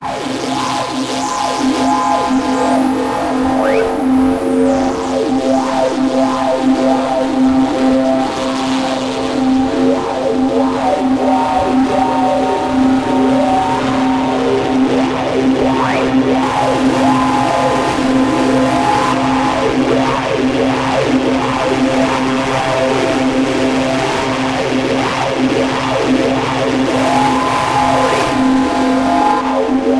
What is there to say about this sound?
Sound effects > Experimental
Pergullator Drone-006
an experimental drone made of layered analog and digital hard unti synths and vst effects
alien, aliens, ambient, atmosphere, brooding, creepy, destroyed, Drone, effect, epic, Experimental, fx, horror, insane, looming, noise, noisey, otherworldly, phase, rubbish, sci-fi, scifi, sfx, spacey, spce, strange, waveform, weird, wtf